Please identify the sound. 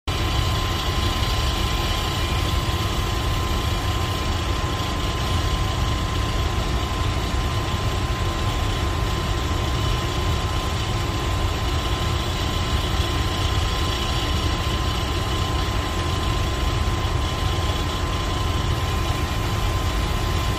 Sound effects > Other mechanisms, engines, machines
Small Motor SFX
Machinery, Hum, MACHINE, Motor, GENERATOR